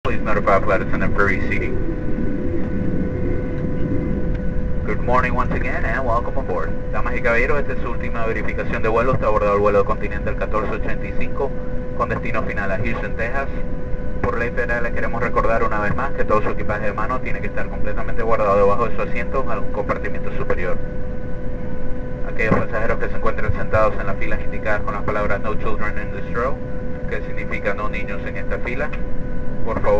Speech > Other
Plane SpeakerAnnouncement
Flight attendant announcement on an airplane in English and Spanish
male, speaker